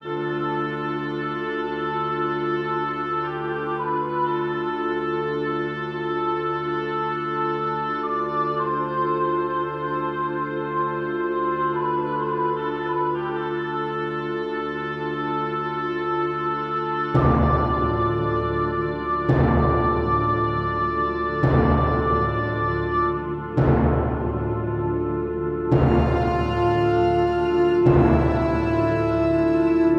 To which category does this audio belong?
Music > Multiple instruments